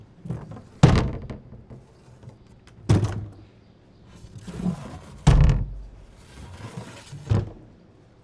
Sound effects > Other mechanisms, engines, machines
250629 Albi Marché couvert - Bin - MKE600
Subject : Bins near the "marché couvert". Date YMD : 2025 June 29 Sunday Morning (07h30-08h30) Location : Albi 81000 Tarn Occitanie France. Sennheiser MKE600 with stock windcover P48, no filter. Weather : Sunny no wind/cloud. Processing : Trimmed in Audacity. Notes : There’s “Pause Guitare” being installed. So you may hear construction work in the background. Tips : With the handheld nature of it all. You may want to add a HPF even if only 30-40hz.
Sunday, 2025, Shotgun-mic, 81000, Albi, closing, Morning, bin, June, City, Occitanie, MKE600, Early-morning, Hypercardioid, cling, opening, open, clang, close, Tarn, France, recessed-bin, Outdoor